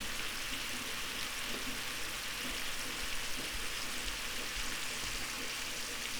Sound effects > Objects / House appliances
Diced potatoes being shallow fried in oil which doesn't sound too aggresive